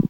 Sound effects > Experimental
Analog Bass, Sweeps, and FX-024
alien, analog, analogue, bass, basses, bassy, complex, dark, effect, electro, electronic, fx, korg, mechanical, oneshot, pad, retro, robot, robotic, sample, sci-fi, scifi, sfx, snythesizer, sweep, synth, trippy, vintage, weird